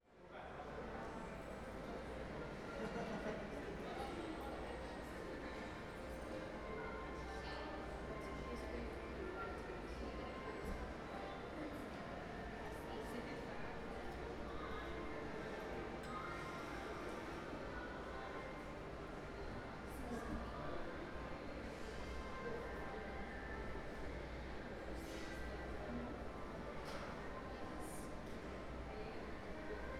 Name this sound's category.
Soundscapes > Indoors